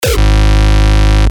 Instrument samples > Percussion
Frechcore kick Testing 1-#G 195bpm
Bass synthed with phaseplant only.
Distorted, Frechore, hardcore, Hardstyle, Kick